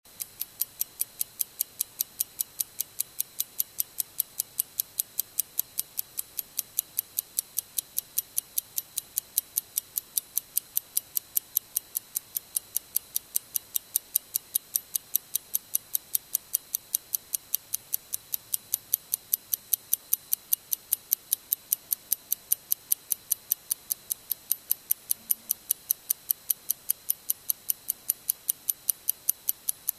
Sound effects > Experimental
Mechanical stopwatch ticking 30 seconds.